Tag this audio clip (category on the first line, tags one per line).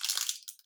Instrument samples > Percussion
tape
organic
foley
electronic
found
creative
one
sound
shot
adhesive
unique
samples
IDM
texture
cinematic
glitch
experimental
shots
layering
sounds
ambient
lo-fi
percussion
drum
sample
DIY
design
cellotape
pack